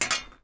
Sound effects > Objects / House appliances
Junkyard Foley and FX Percs (Metal, Clanks, Scrapes, Bangs, Scrap, and Machines) 2
Clang, Clank, Environment, Foley, garbage, Machine, rattle, Robot, rubbish, scrape, trash, waste